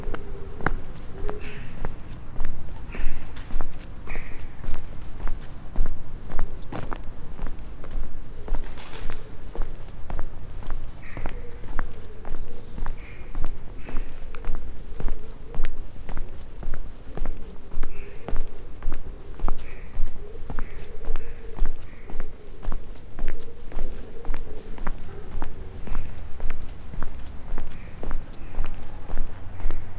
Sound effects > Human sounds and actions
Walking in city - 250607 06h03ish Albi Rue Timbal
Subject : Date YMD : 2025 06 07 (Saturday). Early morning. Time = Location : Albi 81000 Taarn Occitanie France. Hardware : Tascam FR-AV2, Rode NT5 with WS8 windshield. Had a pouch with the recorder, cables up my sleeve and mic in hand. Weather : Grey sky. Little to no wind, comfy temperature. Processing : Trimmed in Audacity. Other edits like filter, denoise etc… In the sound’s metadata. Notes : An early morning sound exploration trip. I heard a traffic light button a few days earlier and wanted to record it in a calmer environment.
2025, 81000, Albi, City, Early, Early-morning, footsteps, France, FR-AV2, hand-held, handheld, Mono, morning, NT5, Occitanie, Outdoor, Rode, Saturday, Single-mic-mono, Tarn, Tascam, urbain, walk, Walking, Wind-cover, WS8